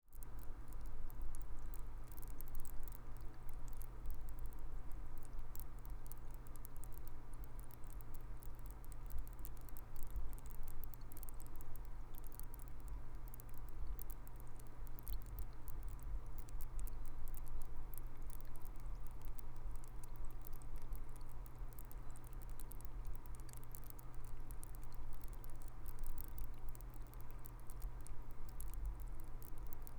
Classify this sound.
Sound effects > Natural elements and explosions